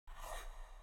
Sound effects > Other
slide stone short 1
cave, mine, move, rock, slide, stone, stones